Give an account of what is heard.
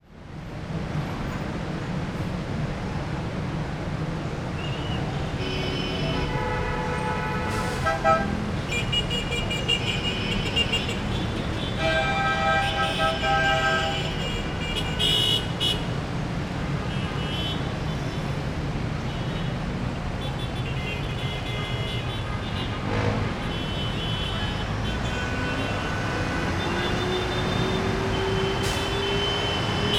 Soundscapes > Urban
250806 201202 PH Heavy traffic in Manila
Heavy traffic in Manila. I made this recording from a footbridge, over EDSA (in Manila, Philippines). One can hear lots of vehicles passing by in the humid street, like cars, motorcycles, trucks and jeepneys, as well as horns, and voices of the men calling passengers to ride in their jeepneys. Recorded in August 2025 with a Zoom H5studio (built-in XY microphones). Fade in/out applied in Audacity.
motorcycles, truck, road, horn, motorcycle, Philippines, car, honking, soundscape, ambience, trucks, humid